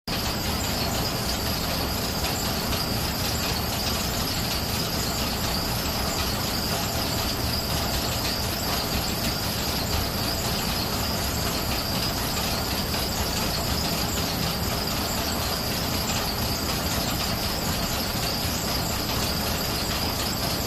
Sound effects > Other mechanisms, engines, machines
Exhaust Fan SFX
Exhsustfan, Factory, Fan, Machinery